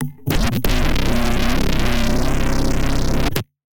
Sound effects > Electronic / Design
Optical Theremin 6 Osc Shaper Infiltrated-003
Alien, Analog, DIY, Electronic, Experimental, IDM, Machine, Noise, Oscillator, Pulse, Robot, SFX, Synth, Weird